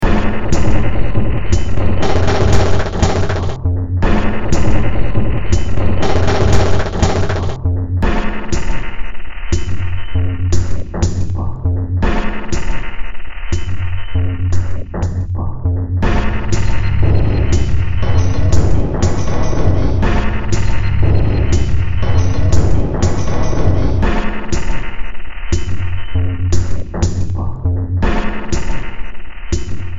Music > Multiple instruments
Demo Track #2953 (Industraumatic)
Ambient, Cyberpunk, Games, Horror, Industrial, Noise, Sci-fi, Soundtrack